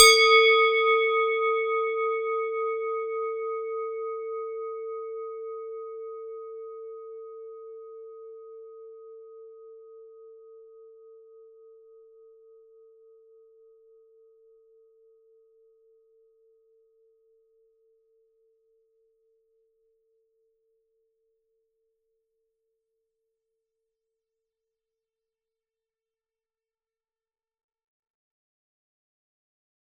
Instrument samples > Percussion
Swiss cowbell 14Wx11Hcm - Close rim mic 3
Subject : A cowbell (actual bell not the instrument) 14cm large by 11cm high. Recorded with the microphone on the side of the rim, very close <1cm. Date YMD : 2025 04 21 Location : Gergueil France. Hardware : Tascam FR-AV2 Rode NT5 microphones. Weather : Processing : Trimmed and Normalized in Audacity. Probably some Fade in/outs too.